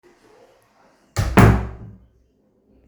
Sound effects > Other
This recording captures the sound of a door closing in an indoor environment. The door makes a clear closing sound with a slight click at the end. Useful for Foley, transitions, room scenes, or general household sound effects.
closing-door, door, door-click, door-close, door-shut